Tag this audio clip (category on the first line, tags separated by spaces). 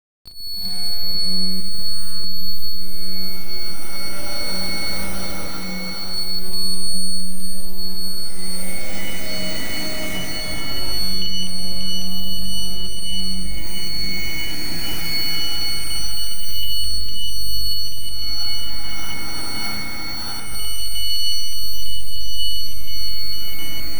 Soundscapes > Synthetic / Artificial
electronic experimental free glitch granulator noise packs sample samples sfx sound-effects soundscapes